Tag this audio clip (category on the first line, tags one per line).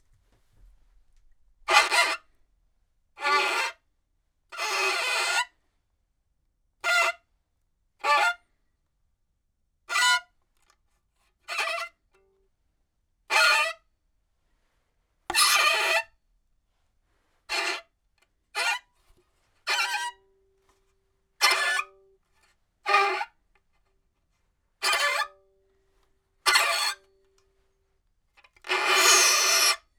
Sound effects > Objects / House appliances
bowed
violin
strings
fiddle